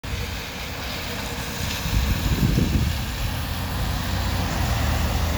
Soundscapes > Urban
A bus passing the recorder in a roundabout. The sound of the bus engine can be heard with sound of rain in the background. Recorded on a Samsung Galaxy A54 5G. The recording was made during a windy and rainy afternoon in Tampere.
rain, bus, passing